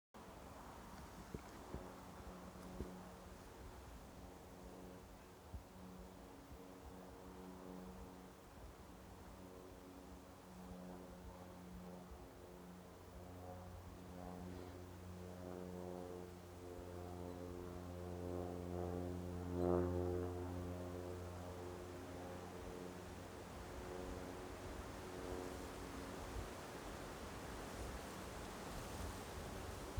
Soundscapes > Nature
Mildura - Plane Landing
Soundscape recording from outback Australia - Mildura. Plane in distance. wind, very quiet recording.
Mildura, wind